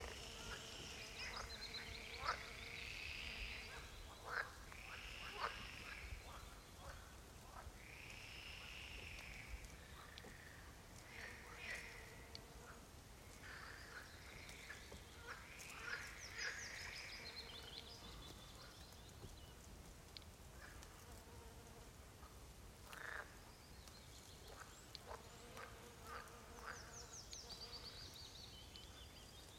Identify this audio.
Sound effects > Animals
Frogs, early morning, early summer. Frogs calling and spawning. Änggårdsbergen Göteborg.